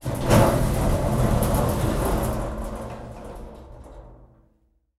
Sound effects > Objects / House appliances
Elevator arrive
Vintage elevator arriving with mechanical groan and door shudder, recorded in an old residential building. This is a demo from the full "Apartment Foley Sound Pack Vol. 1", which contains 60 core sounds and over 300 variations. Perfect for any project genre.
arrival, building, door, elevator, industrial, mechanical